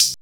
Instrument samples > Synths / Electronic
606ModHH OneShot 04
Sounds made using a Modified TR 606 Drum Machine
606, Analog, Bass, Drum, DrumMachine, Electronic, HiHat, Kit, Mod, Modified, music, Synth, Vintage